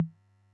Instrument samples > Percussion
tom 1 casiotone
Sampleando mi casiotone mt60 con sus sonidos de percusión por separado Sampling my casiotone mt60 percusion set by direct line, sparated sounds!
casiotone, percusion, sample